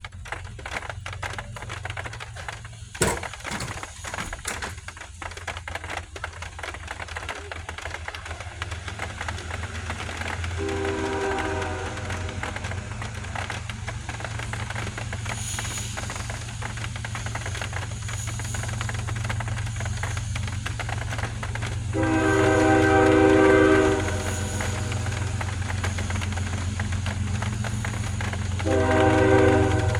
Soundscapes > Urban
Rain And Train And Car
This is a recording of the rain outside my bedroom window. A train passes by, then a car.